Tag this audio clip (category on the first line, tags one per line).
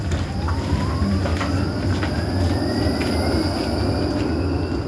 Sound effects > Vehicles
Tram
Vehicle
Transportation